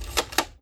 Sound effects > Objects / House appliances
TOYMisc-Samsung Galaxy Smartphone, CU Nerf Super Soaker, Cock Nicholas Judy TDC
A nerf super soaker cock. Recorded at Goodwill.